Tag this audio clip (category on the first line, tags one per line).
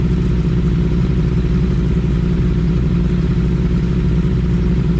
Sound effects > Other mechanisms, engines, machines
Supersport
Ducati
Motorcycle